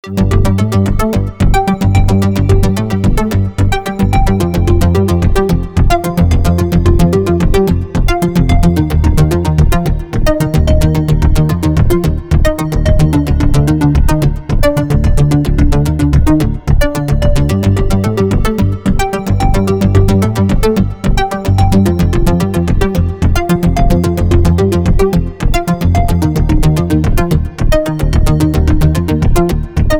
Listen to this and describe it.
Music > Solo instrument
A simple composition I made with nexus. This composition is fantastic. Ableton live.